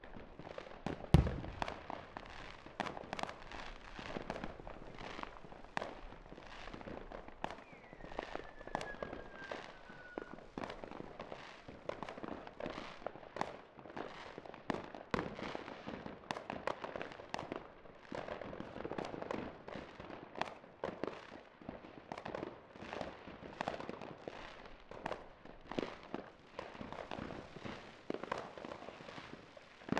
Sound effects > Natural elements and explosions
Fireworks Deep Sub Blast With Crackling Fall And Whistler
Stereo field recording of distant and near fireworks at the beginning of a New Year’s Eve celebration. Individual explosions with natural spacing, recorded outdoors with wide stereo image. Suitable for film, game ambience, documentaries and sound design. Recorded using a stereo A/B setup with a matched pair of RØDE NT5 microphones fitted with NT45-O omni capsules, connected to an RME Babyface interface. Raw field recording with no post-processing (no EQ, compression or limiting applied).